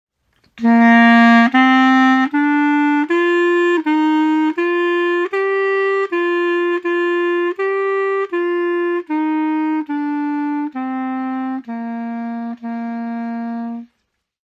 Music > Solo instrument
Clarinet Solo – Raw (Unprocessed)
A short clarinet solo, performed and recorded by myself using an iPhone. This recording was made for learning purposes and later analyzed in Melodyne to study tuning and timing. The sound is clean and natural, without additional processing — suitable for educational use, pitch analysis, or as creative source material. Klarnetisoolo, mille mängisin ja salvestasin ise iPhone’i abil. Salvestis on tehtud õppimise eesmärgil ning hiljem analüüsitud Melodyne programmis, et uurida häälestust ja tempot. Heli on puhas ja loomulik, ilma järeltöötluseta, sobides hästi õppematerjaliks, toonikõrguse analüüsiks või kasutamiseks loomingulistes projektides. Tempo on ligikaudu 80 BPM ning fail on eksporditud 24-bitise kvaliteediga.
acoustic, analysis, Bb, clarinet, clean, instrument, natural, pitch, recording, solo, tone, wind